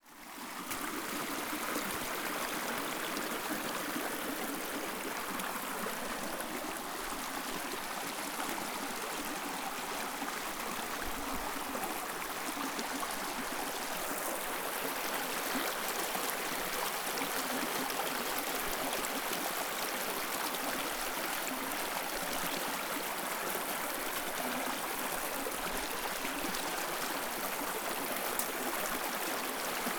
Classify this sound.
Soundscapes > Nature